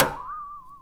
Sound effects > Other mechanisms, engines, machines
Handsaw Pitched Tone Twang Metal Foley 17
foley, fx, handsaw, hit, household, metal, metallic, perc, percussion, plank, saw, sfx, shop, smack, tool, twang, twangy, vibe, vibration